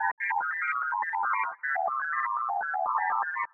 Sound effects > Electronic / Design
Evil robot 2
Evil robot sound designed for a sci-fi videogame.
enemy, evil, fantasy, robot, sci-fi, sfx, sounddesign, videogame